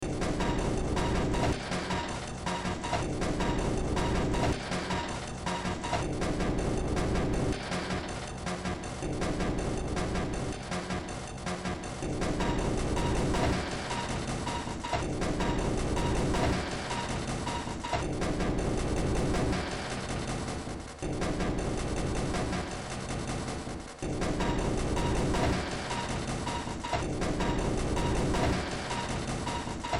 Music > Multiple instruments
Short Track #4043 (Industraumatic)
Ambient, Cyberpunk, Games, Horror, Industrial, Noise, Sci-fi, Soundtrack, Underground